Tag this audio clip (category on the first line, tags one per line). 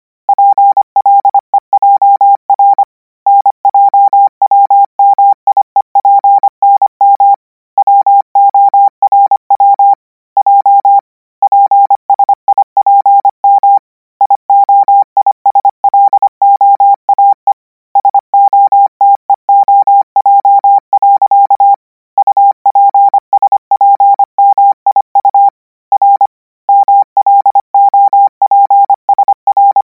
Sound effects > Electronic / Design
characters
code
codigo
morse
radio